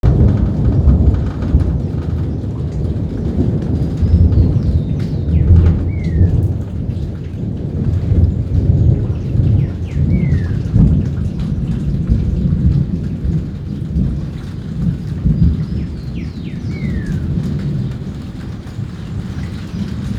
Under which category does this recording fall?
Soundscapes > Nature